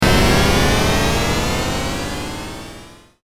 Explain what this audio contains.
Sound effects > Vehicles
car speeding away Made in beepbox
vroom, car, vehicle